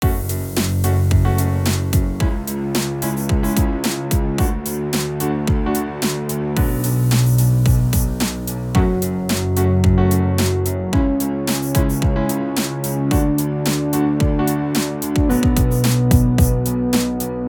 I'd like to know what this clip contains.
Music > Multiple instruments
Violin Drum loop
A loop with a synthesizer, electronic drums, piano, and low pitched violin. Could be used for making music or maybe the background to a video. It has 4/4 time signature, is in F# minor, is 110 bpm. It is made in garage band without AI or samples. Comment what you’re using this for, My songs get so many downloads, but I never know why people would need them.
110bpm, Background, Bass, Beat, drum, FsharpMinor, Game, Kick, Piano, Snare, Strings, Synth, Video, Violin